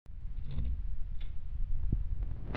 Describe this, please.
Sound effects > Objects / House appliances
Opening door sound effect. Recorded with Android mobile phone.
hum, door